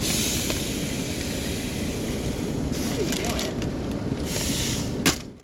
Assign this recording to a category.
Sound effects > Objects / House appliances